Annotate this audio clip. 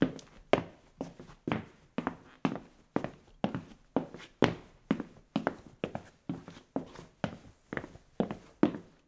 Sound effects > Human sounds and actions
footsteps, fast walk, hardwood01
Recorded with rode nt1
foley, footsteps, hardwood, nt1, Recorded, rode, walk, walking